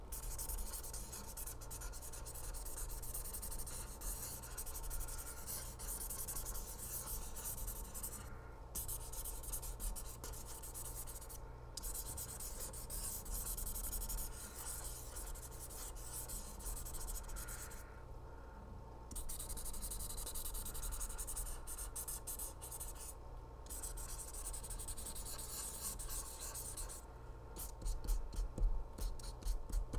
Sound effects > Objects / House appliances
OBJWrite-Blue Snowball Microphone Crayola Washable Dry Erase Marker, Writing Nicholas Judy TDC
A crayola washable dry erase marker writing.
Blue-brand, dry-erase, Blue-Snowball, write, crayola, washable, marker, foley